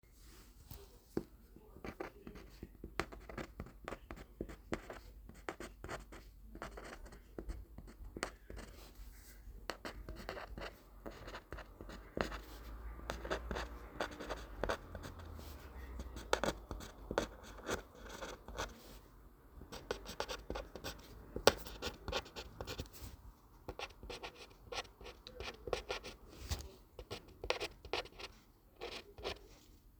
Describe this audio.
Sound effects > Other
사각사각 필기 소리
This is a recording of writing on paper using a mechanical pencil. Captures the subtle sounds of the pencil tip scratching across the paper, perfect for ASMR, sound design, or educational projects.